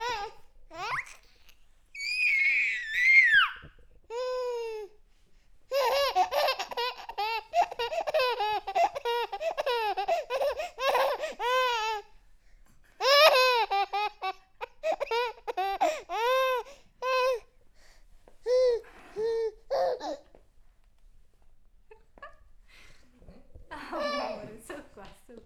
Speech > Solo speech
Baby daughter laughing
my baby daughter laughting at 11 months. Recorded with Tascam H5
laughing, laugh, child, happy, infant